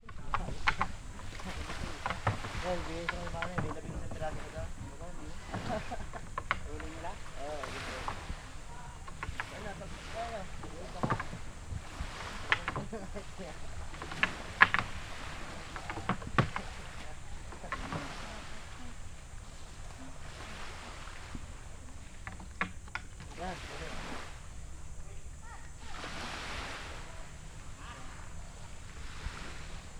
Sound effects > Human sounds and actions
250821 071557 PH fisherman removing fishnet from bangka
Fishermen removing fishnet from a Bangka. I made this recording in the morning, in Tacligan (near San Teodoro, Oriental Mindoro, Philippines), while fishermen were removing a fishnet (with some fishes inside) from their Bangka, which is a small wooden outrigger canoe. In the background, sea waves, cicadas, some birds, and sounds from the surroundings. Recorded in August 2025 with a Zoom H5studio (built-in XY microphones). Fade in/out applied in Audacity.
village, fisherman, people, fishnet, morning, fishermen, soundscape, men, voices, fish, atmosphere, Tacligan, birds, cicadas, children, fishing, Philippines, field-recording, boat, Bangka, sea, beach, fisher, waves, ambience